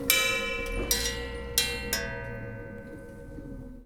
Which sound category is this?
Sound effects > Objects / House appliances